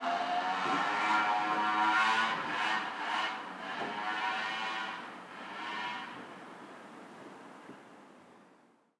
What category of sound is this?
Soundscapes > Urban